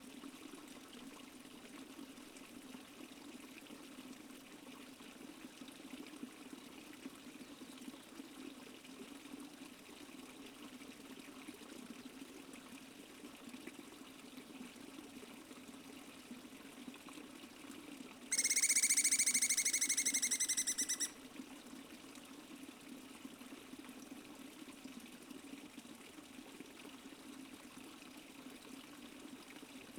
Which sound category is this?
Soundscapes > Nature